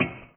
Sound effects > Electronic / Design
vg select
Metallic sound that fits nicely as a select or confirm action for UI/GUI purposes. Slowed down from a saw pulling over, recorded with my phone.
cideogame, confirm, metal, select, sfx, ui, vgsfx